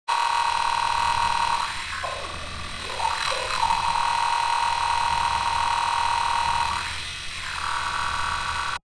Sound effects > Electronic / Design
Alien Robot Animalia-002
Synthesis, Creature, Spacey, Mechanical, Neurosis, Experimental, Digital, FX, Abstract, Buzz, Robotic, Creatures, Otherworldly, Trippy, Trippin, Glitch, Automata, Droid, Drone, Noise, Alien, Analog